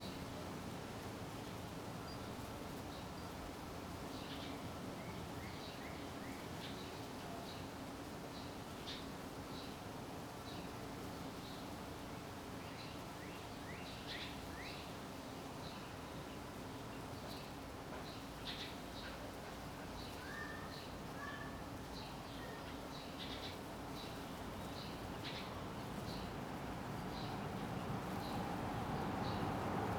Soundscapes > Urban
exterior deck 20250507 01
Part of my "home ambience journal" pack, documenting an urban location over time under different environmental conditions. See the pack description for more about this location and its sonic characteristics. The ambiance of the rear deck of a house in the Central Hill neighborhood of Somerville, Massachusetts, in the Boston region. Recorded on 2025-05-07 at about 14:20. It was warm but cloudy: about 71F / 22C, 54% humidity, light breezes. The weather's not as sunny and the microphone pattern is different. This recording captures the sounds of bees buzzing in the wisteria bush that's about 5 yards / meters away from the listening position. The microphone pattern is different to portray this more clearly. The wisteria had just bloomed and there were a huge number of big bumblebees buzzing around in it. Audible elements: * Birds (House Sparrow, Mourning Dove).
ambiance, ambience, birds, boston, field-recording, massachusetts, somerville-ma, spring